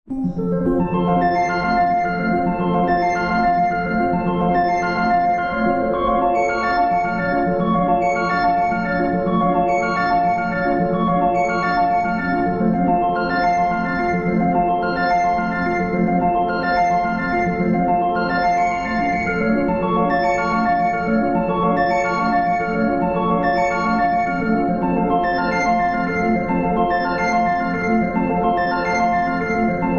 Music > Solo instrument
chaos calliope
The chaos circus comes town with a swirl of synth notes in the sound of a calliope instrument. Made with my MIDI controller, GarageBand, and BandLab.